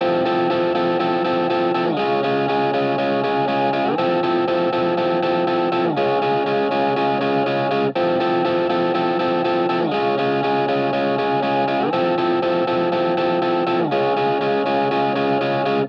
Music > Solo instrument
Guitar loops 124 03 verison 03 120.8 bpm
bpm, electric, electricguitar, free, guitar, loop, music, reverb, samples, simple, simplesamples